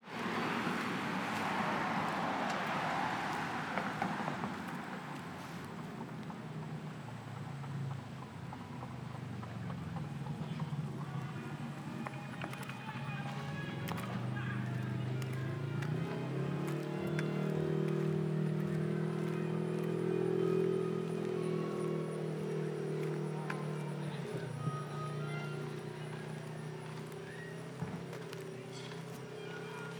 Soundscapes > Urban
Apple Festival Parade warmup vTWO September 13 2025
Parades, I'm sure, number the tens of thousands on this planet every year. Many people enjoy watching parades and even taking part in parades. Here in southern Illinois, every year in the fall the Apple Festival Parade attracts thousands of spectators and is quite popular with young and old. I enjoy the parade. However, being a field recordist, I'm also fascinated by the staging areas, where the bands begin their warmups and "practice their chops". Similar to pulling back the curtain in the Wizard of Oz to see the wizard making the sounds. This recording, I did, in a similar setting. I was about five blocks from the main street where the actual parade route is, watching the big school buses, and charter buses, stop and let the marching bands off where they could warm up. Towards the very end of this recording, you will hear -- in the distance -- the sirens of the police cars and fire engines, kicking off this year's Apple Festival Parade.
Marching-Band, Outdoors, Parade, Parade-Warm-up